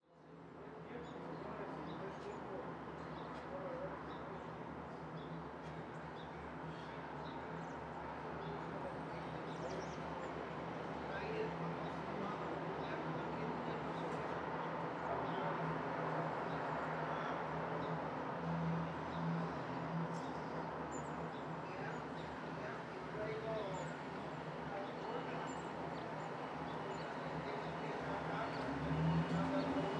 Soundscapes > Urban
Some workers leaf blowing around my building regardless if there are leaves or not.
field-recording, noise-pollution, work, annoying, motor, noise, urban, fall, machine, city